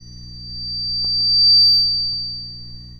Sound effects > Electronic / Design
static Feddback 2
interference, white-noise